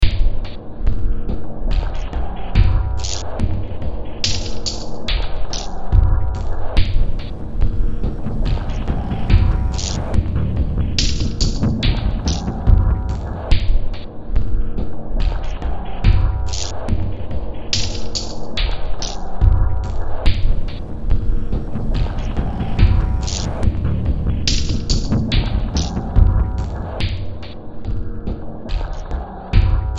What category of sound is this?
Music > Multiple instruments